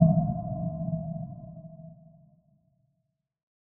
Sound effects > Electronic / Design
METALLIC POWERFUL LASTING IMPACT
BASSY, BOOM, DEEP, DESIGN, DIRECT, EDITING, EXPLOSION, HIT, IMPACT, LAYERING, LOW, RATTLING, RUMBLING, SIMPLE